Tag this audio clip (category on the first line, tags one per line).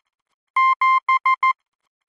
Sound effects > Electronic / Design
Language
Morse
Telegragh